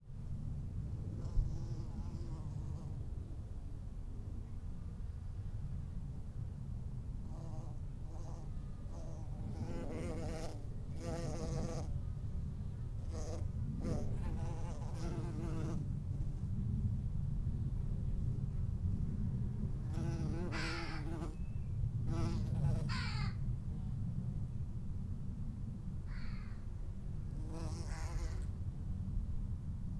Soundscapes > Nature
Bees on lavender in the summer
The recorder was placed next to a row of lavender in a sunny garden on a hot day. Bees coming and going and the low rumble of a distant aeroplane can be heard.
bee, bees, buzzing, field-recording, garden, lavender, summer